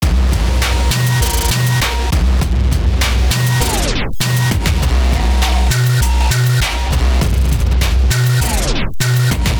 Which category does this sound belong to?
Music > Multiple instruments